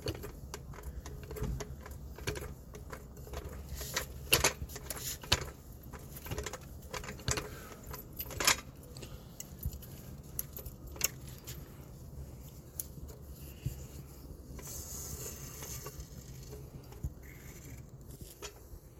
Sound effects > Other mechanisms, engines, machines
MECHMisc-Samsung Galaxy Smartphone, MCU Car Jack, Raise, Lower Nicholas Judy TDC
A car jack raising, then lower.
car, foley, jack, lower, Phone-recording, raise